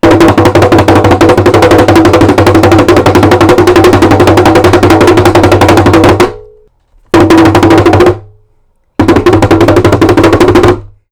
Music > Solo percussion
MUSCPerc-Blue Snowball Microphone, CU Djembe, Rolls Nicholas Judy TDC
Blue-brand, Blue-Snowball, djembe, jembe, roll